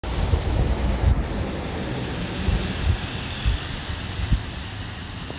Soundscapes > Urban
A bus passing the recorder in a roundabout. Recorded on a Samsung Galaxy A54 5G. The recording was made during a windy and rainy afternoon in Tampere.